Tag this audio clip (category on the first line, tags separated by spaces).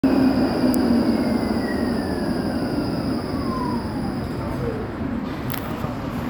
Sound effects > Vehicles
traffic public-transport tram city